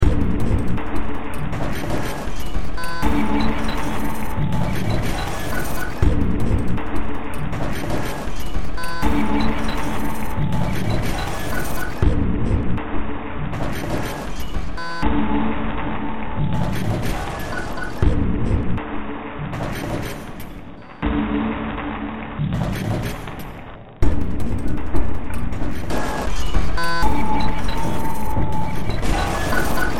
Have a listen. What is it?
Music > Multiple instruments
Demo Track #3482 (Industraumatic)
Ambient, Cyberpunk, Games, Horror, Industrial, Noise, Sci-fi, Soundtrack, Underground